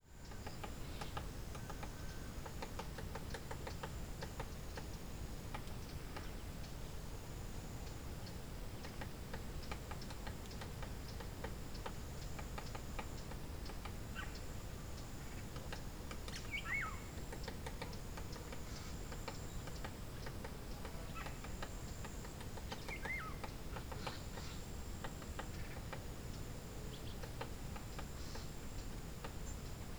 Soundscapes > Nature
woodpecker et alt
Soundscape of dawn in a rural landscape. You can hear: turtledoves, pheasants, magpies, grey crows, sparrows and several other unidentified birds. In the background, there is the chirping of crickets and the sound of cars in the distance or passing on a gravel road near the house. In particular, in this recording, you can hear the sound of a woodpecker repeatedly tapping on the bark of a tree not far from the recording point.
ambience, birds, dawn, field-recording, freesound20, italy, nature, outdoor, summer